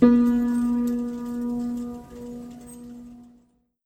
Music > Solo instrument
MUSCKeyd-Samsung Galaxy Smartphone, CU Piano, Note, Reverb Nicholas Judy TDC
A piano note with reverb. Recorded at Goodwill.